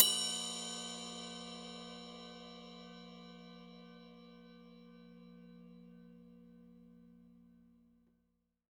Music > Solo instrument
Cymbal hit with knife-001
Cymbal, Hat, GONG, Metal, FX, Custom, Paiste, Cymbals, Percussion, Ride, Kit, Sabian, Drum, Perc, Oneshot, Drums, Crash